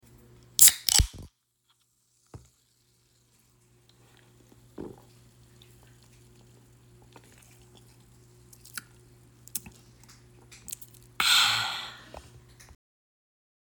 Sound effects > Human sounds and actions
crack open soda can and take a sip.
drink, satisfaction, soda, taste, thirst